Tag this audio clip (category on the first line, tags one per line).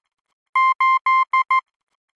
Sound effects > Electronic / Design
Language
Telegragh
Morse